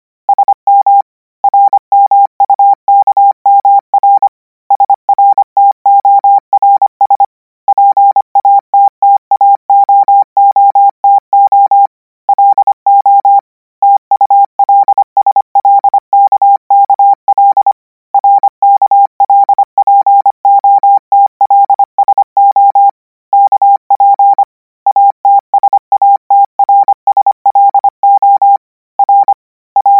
Sound effects > Electronic / Design
Koch 10 KMRSUAPTLO - 400 N 25WPM 800Hz 90%
Practice hear characters 'KMRSUAPTLO' use Koch method (after can hear charaters correct 90%, add 1 new character), 400 word random length, 25 word/minute, 800 Hz, 90% volume.
morse, radio, characters, code, codigo